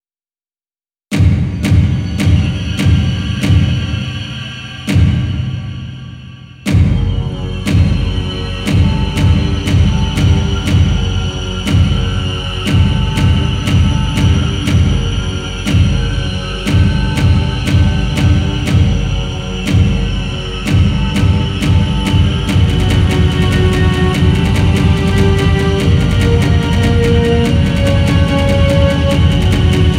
Music > Multiple instruments
Horror chase song, slow (120 BPM) then fast (145 BPM) and ends slow again
atmosphere, music, horror, dark